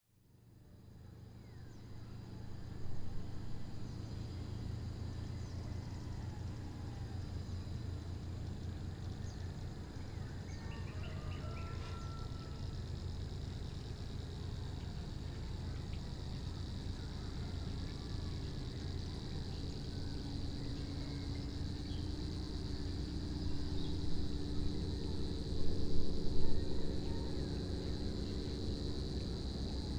Soundscapes > Other
Chorus of Cicadas and loud motorboat. I recorded this file at about 5:25AM, from the terrace of a house located at Santa Monica Heights, which is a costal residential area near Calapan city (oriental Mindoro, Philippines). However, this dawn recording has been quite unusual. Even if there was some down chorus from local birds, please pay attention to the strange atmosphere created by the cicadas starting to sing while the noise of a very loud motorboat (fast ferry) increases gradually ! At #2:51, the bell of the nearby church started ringing, adding even more flavour to this recording. Recorded in August 2025 with a Zoom H6essential (built-in XY microphones). Fade in/out applied in Audacity.